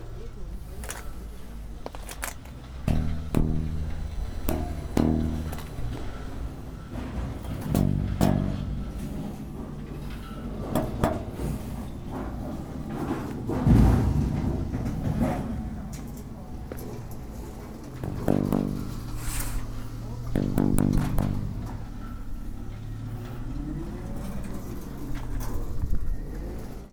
Sound effects > Objects / House appliances
Junkyard Foley and FX Percs (Metal, Clanks, Scrapes, Bangs, Scrap, and Machines) 200
Percussion, Robotic, SFX, Clank, waste, trash, Clang, Metal, FX, Robot, Perc, Foley, rattle, Dump, Bash